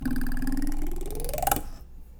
Sound effects > Objects / House appliances
knife and metal beam vibrations clicks dings and sfx-036
Clang, Klang, Perc, Trippy, Vibrate, Vibration, Wobble